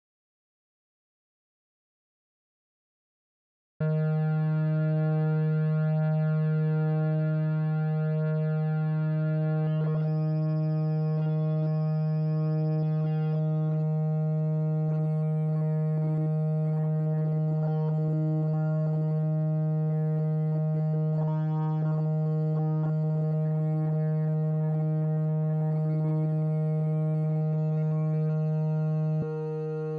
Sound effects > Electronic / Design
Humming Signals - Sci fi / Synth
Humming sounds. Playing with synth knobs. ...... someone somewhere wants to say something / alguien en alguna parte quiere decir algo